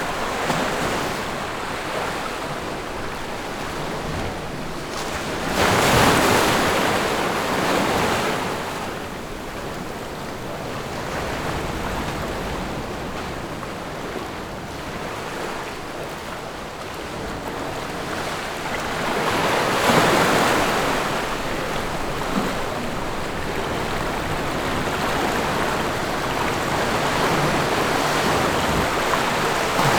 Soundscapes > Nature
Beach Cave XY ST
Tascam dr-100mkiii Rhode XY stereo mic
ocean, sea, beach